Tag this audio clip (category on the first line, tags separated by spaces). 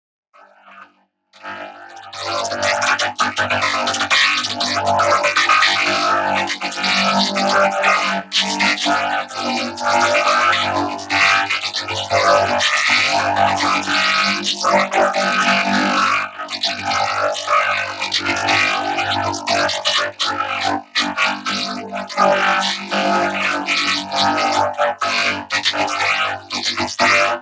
Sound effects > Electronic / Design
Glitch Robot Synthtic